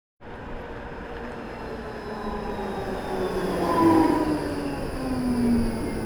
Sound effects > Vehicles
A Tram passes by
Passing, Tram, Tram-stop